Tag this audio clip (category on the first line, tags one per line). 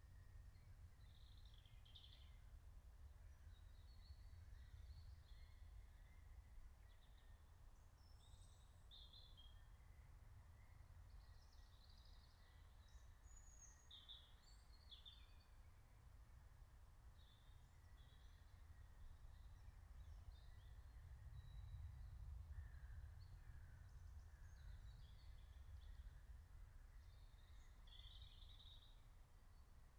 Soundscapes > Nature
natural-soundscape
phenological-recording
alice-holt-forest
field-recording
soundscape
nature
raspberry-pi
meadow